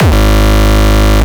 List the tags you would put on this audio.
Instrument samples > Percussion
Hardstyle Kick

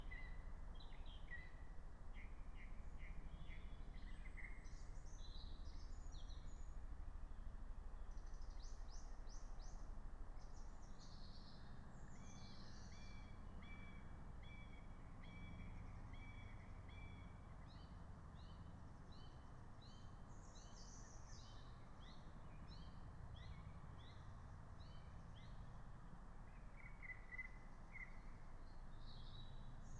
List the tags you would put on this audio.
Soundscapes > Nature
field-recording meadow natural-soundscape nature

